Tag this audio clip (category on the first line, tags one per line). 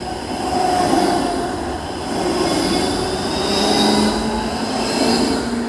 Sound effects > Vehicles
motor
tram